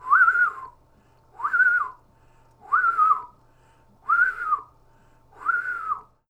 Sound effects > Animals
TOONAnml-Blue Snowball Microphone, MCU Loon Calling, Human Imitation, Cartoon Nicholas Judy TDC
A loon calling. Human imitation. Cartoon.
imitation, call, human, Blue-Snowball, loon, cartoon, Blue-brand